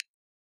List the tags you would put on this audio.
Sound effects > Objects / House appliances
Candle
Burn
Crackle

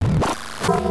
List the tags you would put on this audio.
Sound effects > Electronic / Design
digital
glitch
hard
one-shot
pitched
stutter

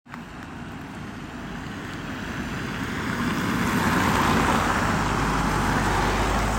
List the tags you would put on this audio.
Sound effects > Vehicles
field-recording
car
tampere

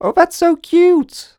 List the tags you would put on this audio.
Speech > Solo speech
affectionate
best-of
cute
dialogue
FR-AV2
Human
Male
Man
Mid-20s
Neumann
NPC
oneshot
singletake
Single-take
talk
Tascam
U67
Video-game
Vocal
voice
Voice-acting
words

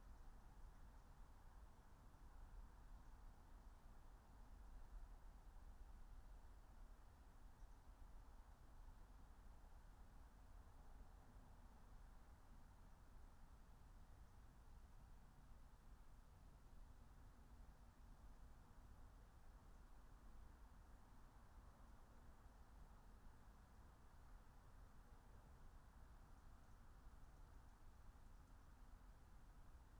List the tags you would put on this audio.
Soundscapes > Nature
phenological-recording
nature
field-recording
alice-holt-forest
meadow
natural-soundscape
raspberry-pi
soundscape

